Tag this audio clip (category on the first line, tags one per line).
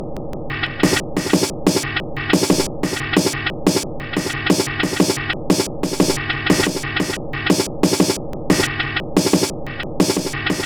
Instrument samples > Percussion
Drum
Loopable
Samples
Soundtrack
Underground